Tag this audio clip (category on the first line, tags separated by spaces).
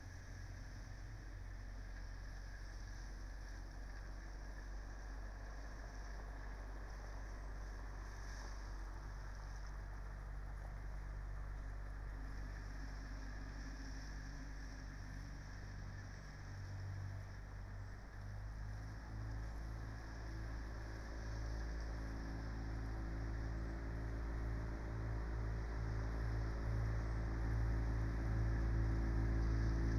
Soundscapes > Nature
alice-holt-forest artistic-intervention data-to-sound Dendrophone field-recording natural-soundscape nature phenological-recording raspberry-pi sound-installation soundscape weather-data